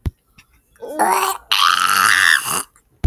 Speech > Solo speech
dude, idk im trying to make some baby vomiting or some disgusting sounds also there were no babies included in this recording